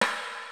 Music > Solo percussion
Snare Processed - Oneshot 90 - 14 by 6.5 inch Brass Ludwig
ludwig, acoustic, brass, hit, snareroll, oneshot, kit, realdrum, crack, snaredrum, percussion, fx, perc, beat, processed, rimshots, rimshot, hits, drum, sfx, flam, realdrums, snare, reverb, drumkit, snares, drums, rim, roll